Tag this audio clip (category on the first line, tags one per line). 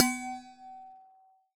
Sound effects > Objects / House appliances
percusive
recording
sampling